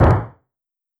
Sound effects > Human sounds and actions
Footstep Gravel Running-01
footstep, gravel, jog, jogging, lofi, run, running, steps, synth